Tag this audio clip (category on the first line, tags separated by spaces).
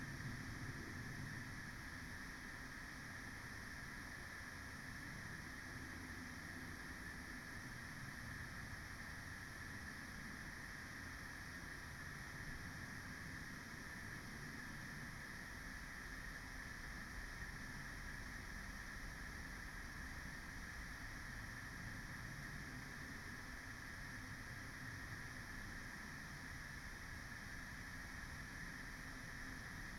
Soundscapes > Nature
data-to-sound phenological-recording raspberry-pi alice-holt-forest Dendrophone modified-soundscape weather-data soundscape nature sound-installation field-recording